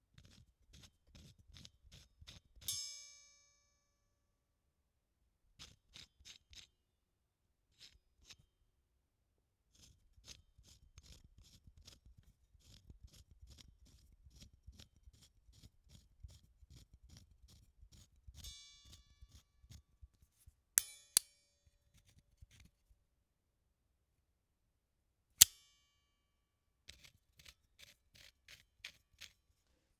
Sound effects > Objects / House appliances
Knipex 41 04 180 locking pliers (Handling noise)
Subject : Recording a Knipex 41 04 180 locking pliers / vice grip. General handling noise. Screwing, clipping air, wood, other. Date YMD : 2025 July 07 Location : Indoors. Sennheiser MKE600 P48, no filter. Weather : Processing : Trimmed and maybe sliced in Audacity.
cling, metal, mke-600, clamping, clamp, knipex, screwing, opening, pliers, close-up, indoor, clang, tascam, Sennheiser, hardware, fr-av2, closing, tool, locking-pliers, mke600